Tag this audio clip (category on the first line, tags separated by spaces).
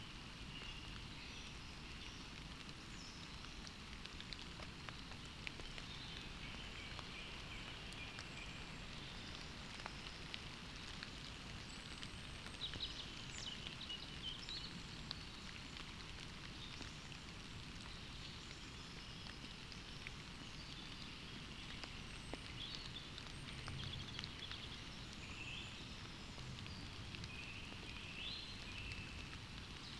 Soundscapes > Nature
artistic-intervention Dendrophone modified-soundscape natural-soundscape nature raspberry-pi sound-installation soundscape weather-data